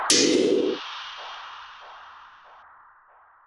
Sound effects > Electronic / Design
Impact Percs with Bass and fx-021
foreboding, ominous, sfx, theatrical, crunch, cinamatic, hit, smash, brooding, percussion, combination, looming, impact, explode